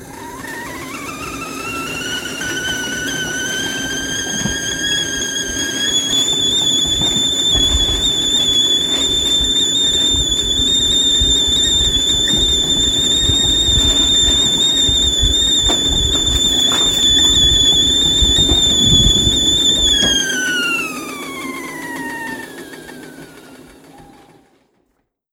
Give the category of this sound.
Sound effects > Objects / House appliances